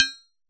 Sound effects > Objects / House appliances
Here you have a pack of *coffee thermos being hit* samples, some with its own lid and some others with a coffee cup, you have to find out which one you're listening.